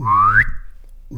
Sound effects > Objects / House appliances
mouth foley-007 hum whistle
whistle, mouth, bubbles, squeek, foley, blow, bubble, perc, sfx, beatbox